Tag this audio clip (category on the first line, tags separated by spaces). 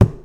Sound effects > Objects / House appliances
fill foley plastic clang bucket slam scoop lid drop clatter knock metal shake liquid debris